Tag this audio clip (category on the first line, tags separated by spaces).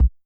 Instrument samples > Percussion
drum jomox kick